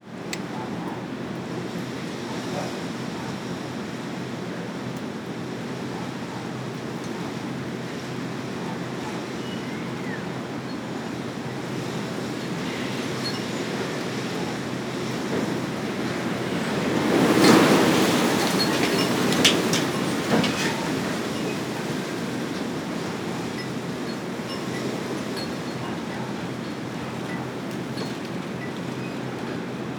Soundscapes > Urban
Moderate wind with strong gusts ambience recording of a suburban backyard at mid afternoon. Sounds ow srubs on metal and wooden fences, creaking branches, dogs barking birds singing leaves rustling and wind chimes chiming.